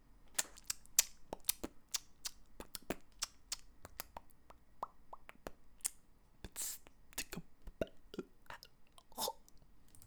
Sound effects > Natural elements and explosions
Background percussion created for food fight on the album SDNNFY without any added effects
beatbox, human, mouth, raw, Sample, unprocessed